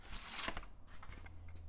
Sound effects > Other mechanisms, engines, machines
metal shop foley -157
oneshot, perc, foley, rustle, metal, tink, fx, strike, sfx, little, thud, bop, percussion, knock, pop, crackle, shop, sound, wood, bang, boom, tools, bam